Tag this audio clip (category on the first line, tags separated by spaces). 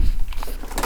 Sound effects > Other mechanisms, engines, machines
foley wood strike knock sfx percussion fx oneshot tink bang pop boom crackle tools perc bop little bam thud metal rustle shop sound